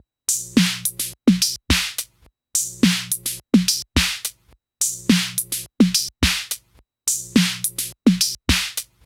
Music > Solo percussion
106 606Filtered Loop 01
606, Analog, Bass, Drum, DrumMachine, Electronic, Kit, Loop, Mod, Modified, music, Synth, Vintage